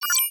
Sound effects > Electronic / Design
UI action sound effect
This sound was made and processed in DAW using only my samples and synths; - Clicky UI sound effect made to represent an action in the menu - I used two synths in this sound effect. First one is a saw wave with some interesting phaser shape + enveloppe with short release. Second one is randomly arpeggiated square wave, also with short realease. Some post-processing and you get this. - Ы.
click, interface, action, sci-fi, user-interface, synthetic, UI, desktop, application, press, button, switch